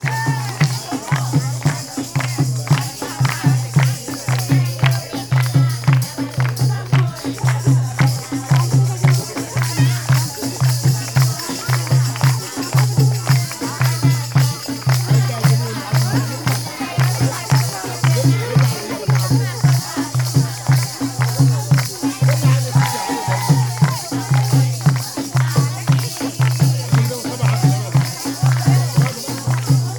Soundscapes > Urban
India Bride (women privé)
Sound recorded in India where I explores the loudness produced by human activity, machines and environments in relation with society, religion and traditional culture.
bells, Hindu, Hinduism, Temple, Yoga